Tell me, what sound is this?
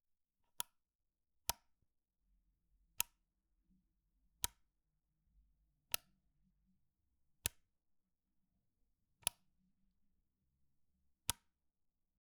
Sound effects > Objects / House appliances
Foley recording of a domestic wall-mounted light switch.